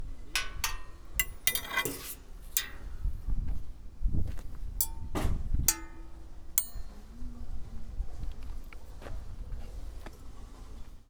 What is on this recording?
Sound effects > Objects / House appliances
Junkyard Foley and FX Percs (Metal, Clanks, Scrapes, Bangs, Scrap, and Machines) 173
Atmosphere, Bang, Clang, Dump, dumping, dumpster, Environment, garbage, Junk, Machine, Metal, Metallic, Percussion, rattle, Robotic, rubbish, SFX, trash, tube, waste